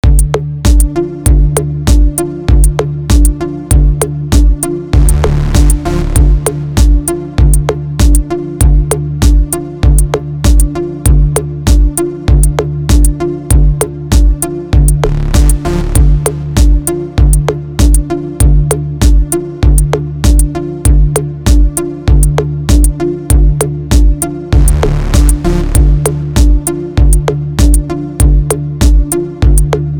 Music > Multiple instruments
Musical Composition
A simple composition I made with serum 2. This composition is fantastic. Ableton live.
synth, Snare